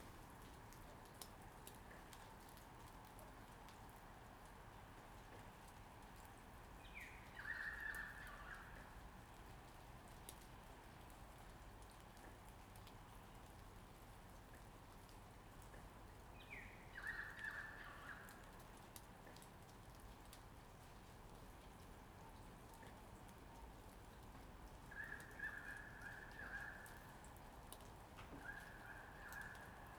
Soundscapes > Nature
After rain - birds, great ocean road, Australia
road, ocean, Australia, great, After, birds, rain